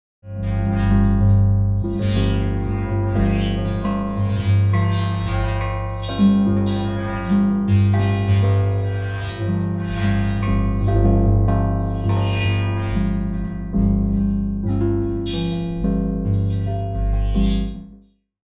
Instrument samples > Synths / Electronic
Tibetan Metallic Resonant Gongs out of a Drum Loop Combo Filter
clang, metallic, combo, resonant, GRMtools, Tibetan, Atelier, gong